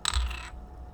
Sound effects > Objects / House appliances
PLASImpt-Blue Snowball Microphone, MCU Ink Cap, Small, Drop, Spin Nicholas Judy TDC
A small ink cap drop and spin.
Blue-brand, Blue-Snowball, cap, drop, foley, ink, small, spin